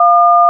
Sound effects > Electronic / Design
This is the number 1 in DTMF This is also apart of the pack 'DTMF tones 0-9'
telephone, dtmf, retro